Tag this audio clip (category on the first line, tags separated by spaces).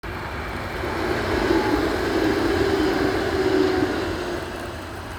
Soundscapes > Urban
field-recording railway Tram